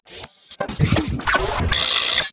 Sound effects > Experimental
Gritch Glitch snippets FX PERKZ-020
laser, abstract, perc, alien, clap, zap, glitchy, otherworldy, sfx, whizz, lazer, impact, idm, pop, glitch, percussion